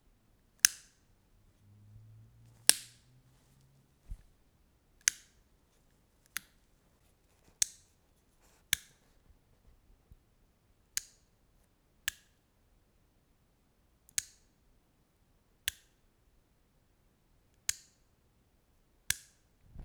Sound effects > Objects / House appliances
Lamp Switch easy Recorded that sound by myself with Recorder H1 Essential / in office